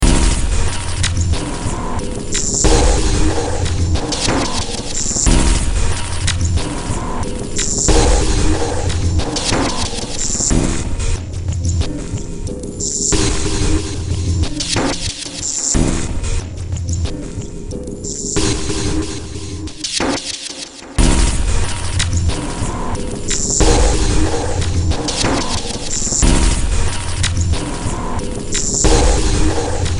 Music > Multiple instruments
Demo Track #3067 (Industraumatic)
Noise, Sci-fi, Games, Horror, Cyberpunk, Ambient, Underground, Industrial, Soundtrack